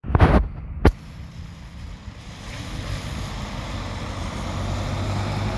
Soundscapes > Urban
A bus passing the recorder in a roundabout. The sound of the bus tires and engine can be heard with rain sound. Some distortion at the beginnig of the recording. Recorded on a Samsung Galaxy A54 5G. The recording was made during a windy and rainy afternoon in Tampere.
bus, passing, rain